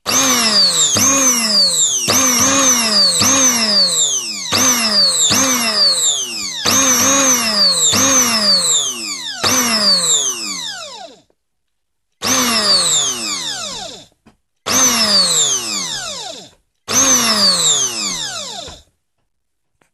Sound effects > Vehicles
recorded at mobil phone
blender, machine, phone